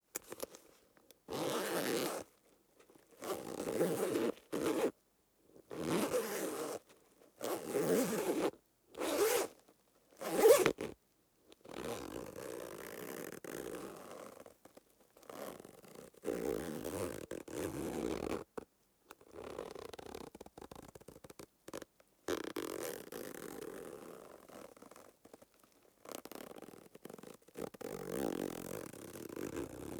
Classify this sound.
Sound effects > Objects / House appliances